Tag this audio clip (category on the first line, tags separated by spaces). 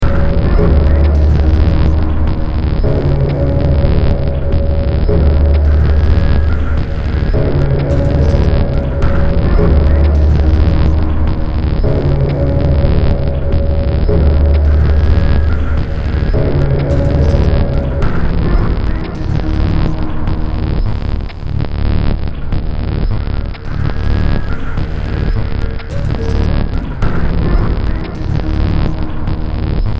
Music > Multiple instruments
Horror Ambient Sci-fi Underground Soundtrack Noise Industrial